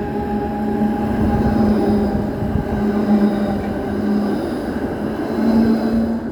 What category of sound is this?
Sound effects > Vehicles